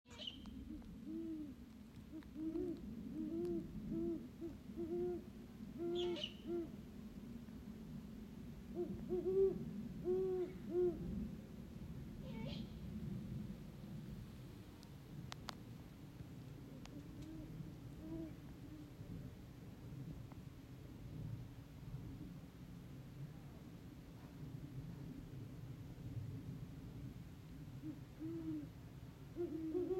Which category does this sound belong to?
Soundscapes > Nature